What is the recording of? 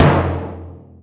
Instrument samples > Percussion
A timpani for rock/metal/pop/electro. tags: kettledrum ngoma percussion taboret talkdrum talking-drum talktom Tama tenor-drum Yamaha